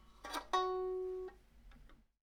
Instrument samples > String
Plucking broken violin string 6
creepy, beatup, violin, strings, unsettling, pluck